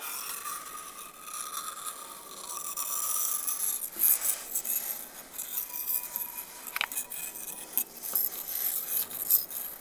Sound effects > Objects / House appliances
Scissor Foley Snips and Cuts 11
scissor scissors foley perc snip cut slice scrape sfx fx household tools metal
snip, household, slice, foley, cut, sfx, scrape, tools, metal, perc, scissor, fx, scissors